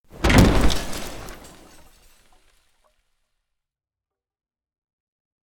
Sound effects > Natural elements and explosions
Giving a little something back. None of the sounds here are themselves explosions. It's clattering dishes, snapping branches, slamming doors, and so on. Combine them all and you get a 100% organic explosion effect.